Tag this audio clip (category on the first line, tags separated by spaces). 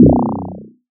Instrument samples > Synths / Electronic
additive-synthesis bass fm-synthesis